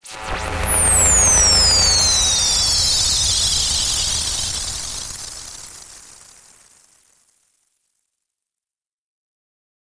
Sound effects > Electronic / Design
Distorted radio signal pulse with glitchy sweep tones.